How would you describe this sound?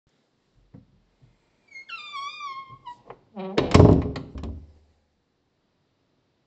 Soundscapes > Indoors
Creaking wooden door v08
Creaking wooden room door closes